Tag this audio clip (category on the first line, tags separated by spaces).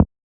Instrument samples > Synths / Electronic
synthetic surge fm